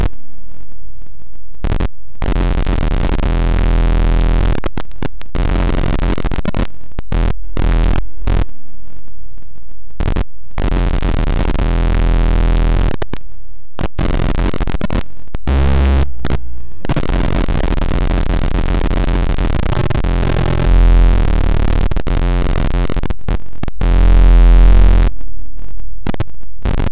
Sound effects > Experimental
torturing my computer
i dont even know.